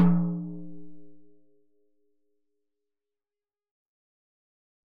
Music > Solo percussion
Hi Tom- Oneshots - 31- 10 inch by 8 inch Sonor Force 3007 Maple Rack
Hi-Tom recording made with a Sonor Force 3007 10 x 8 inch Hi-Tom in the campus recording studio of Calpoly Humboldt. Recorded with a Beta58 as well as SM57 in Logic and mixed and lightly processed in Reaper
percussion, beat, hi-tom, perc, beats, instrument, drums, drum, rimshot, kit, percs, studio, hitom, oneshot, acoustic, fill, flam, tom, rim, roll, beatloop, toms, drumkit, velocity, tomdrum